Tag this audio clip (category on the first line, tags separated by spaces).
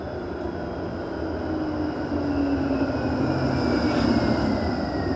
Sound effects > Vehicles
Tram Transportation Vehicle